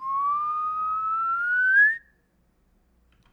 Sound effects > Human sounds and actions
Whistle upwards
Recording of me whistling upwards in pitch. I've used a SM57 dynamic microphone.